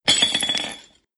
Sound effects > Objects / House appliances
A coca-cola bottle being smashed.